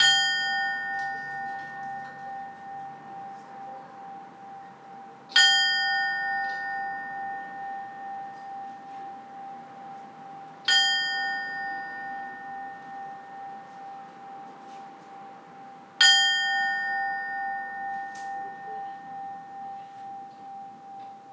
Instrument samples > Percussion
ZL.bell
Orthodox bell. Recorded on the phone.
bell, bells, church, orthodox